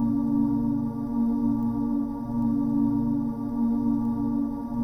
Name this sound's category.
Sound effects > Electronic / Design